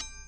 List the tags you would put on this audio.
Sound effects > Objects / House appliances
Beam Clang ding Foley FX Klang Metal metallic Perc SFX ting Trippy Vibrate Vibration Wobble

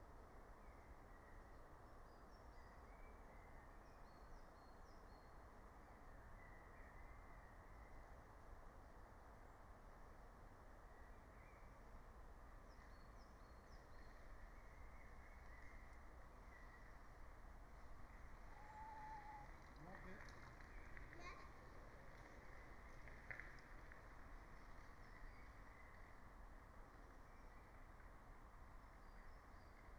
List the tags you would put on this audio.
Soundscapes > Nature
phenological-recording natural-soundscape field-recording raspberry-pi alice-holt-forest soundscape nature meadow

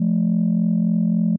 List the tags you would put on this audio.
Instrument samples > Synths / Electronic
Holding-Tone JI JI-3rd JI-Third just-minor-3rd just-minor-third Landline Landline-Holding-Tone Landline-Phone Landline-Phonelike-Synth Landline-Telephone Landline-Telephone-like-Sound Old-School-Telephone Synth Tone-Plus-386c

